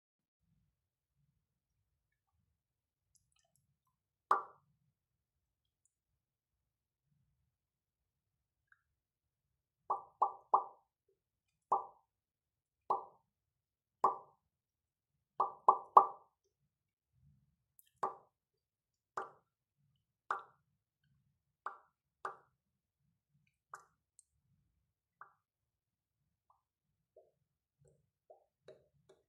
Sound effects > Human sounds and actions
Mouth sounds in a bathroom stall in Liepaja. Recorded on Honor 200 Smart.
unprocessed, resonant, textural